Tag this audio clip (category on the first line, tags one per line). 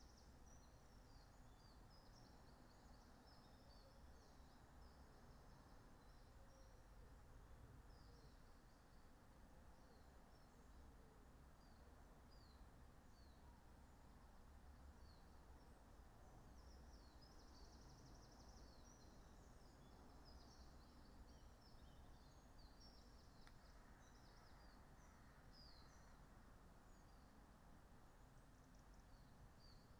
Soundscapes > Nature
alice-holt-forest
artistic-intervention
data-to-sound
Dendrophone
field-recording
modified-soundscape
natural-soundscape
phenological-recording
raspberry-pi
sound-installation
soundscape
weather-data